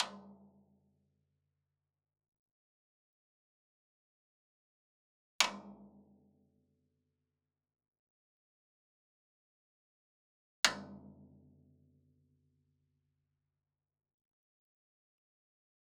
Music > Solo percussion
floor tom- rimshot Oneshots Sequence - 16 by 16 inch
acoustic, beat, beatloop, beats, drum, drumkit, drums, fill, flam, floortom, instrument, kit, oneshot, perc, percs, percussion, rim, rimshot, roll, studio, tom, tomdrum, toms, velocity